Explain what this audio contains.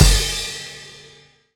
Instrument samples > Percussion
crashkick XWR 2
Avedis, bang, China, clang, clash, crack, crash, crunch, cymbal, Istanbul, low-pitched, Meinl, metal, metallic, multi-China, multicrash, Paiste, polycrash, Sabian, shimmer, sinocrash, sinocymbal, smash, Soultone, spock, Stagg, Zildjian, Zultan